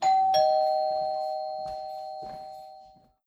Sound effects > Objects / House appliances
BELLDoor-Samsung Galaxy Smartphone Doorbell, Single Ring Nicholas Judy TDC
A single doorbell ring. Recorded at the interior of 9933 Puddle Duck Lane, Mechanicsville, Virginia.